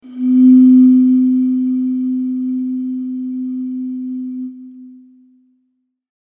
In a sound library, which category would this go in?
Instrument samples > Other